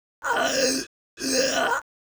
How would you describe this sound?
Speech > Solo speech
Enemy Death Cries
A Sound bite of a human enemy dying for a game project of some sort. Useful to reverse the first sound makes a nice alternative as well so hence two. Made by R&B Sound Bites if you ever feel like crediting me ever for any of my sounds you use. Good to use for Indie game making or movie making. This will help me know what you like and what to work on. Get Creative!
Game, Cries, RPG